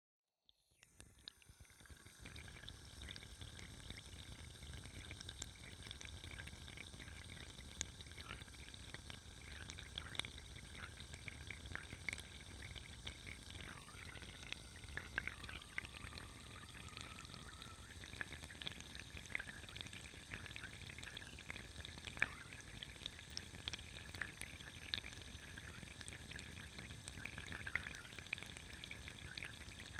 Sound effects > Objects / House appliances
A hydrophone recording of water running from a tap into a kitchen sink.
bathroom, hydrophone, sink, tap, underwater, water